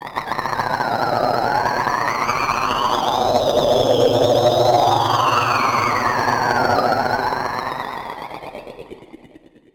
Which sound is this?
Sound effects > Electronic / Design
Optical Theremin 6 Osc ball infiltrated-007
Spacey, Infiltrator, Handmadeelectronic, Noise, Glitchy, DIY, Sweep, Theremins, Otherworldly, Experimental, Analog, Robot, Theremin, Robotic, Electro, Electronic, SFX, noisey, Scifi, Glitch, Alien, Dub, Bass, Sci-fi, FX, Digital, Synth, Optical, Trippy, Instrument